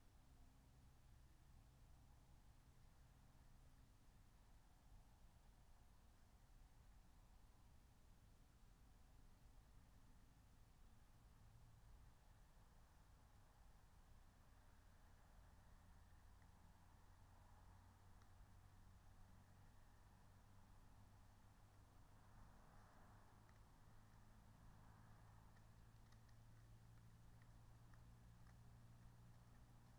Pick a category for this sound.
Soundscapes > Nature